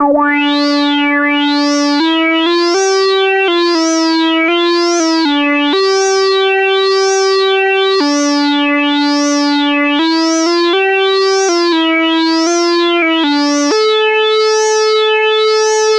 Music > Solo instrument
120 C SX1000 Loop 01
Synth Pad Loop made using Jen Synthetone SX1000 analog synth
Analogue, Rare, Music, Loop, Synth, Vintage